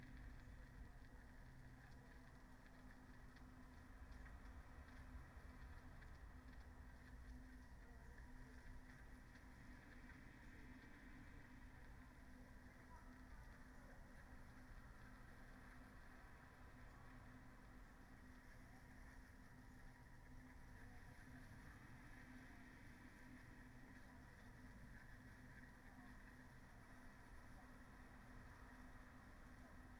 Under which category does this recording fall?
Soundscapes > Nature